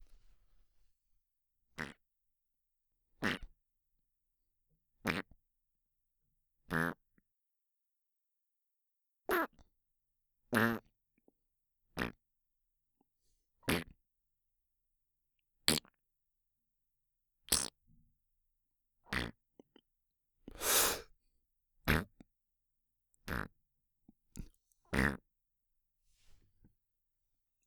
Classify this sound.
Sound effects > Other